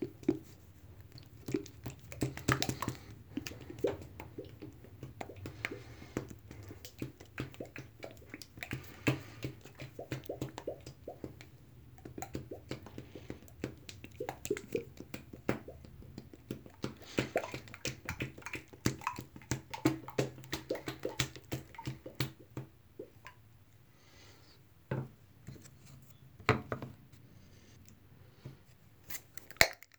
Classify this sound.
Sound effects > Human sounds and actions